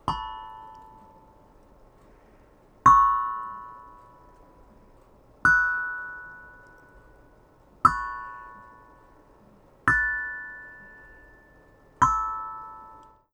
Music > Solo percussion
Loud steel tongue drum notes.